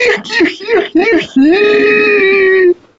Sound effects > Human sounds and actions
Clown Laugh
I saw a clown at Walmart and he laughed at me so I decided to record him.